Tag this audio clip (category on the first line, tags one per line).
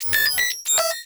Sound effects > Electronic / Design
options
notifications
menu
UI
digital
messages
button
alert
interface